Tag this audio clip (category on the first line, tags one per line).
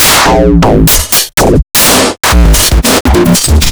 Music > Multiple instruments
loops
drum-loop
overdrive
percs
drum
loop
percussion-loop
drumloops
music
saturation
folded
samples
fizzel
drums
distorted
distorteddrums
120bmp